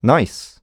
Speech > Solo speech
Joyful - Nice 1
dialogue, FR-AV2, happy, Human, joy, joyful, Male, Man, Mid-20s, Neumann, nice, NPC, oneshot, singletake, Single-take, talk, Tascam, U67, Video-game, Vocal, voice, Voice-acting, word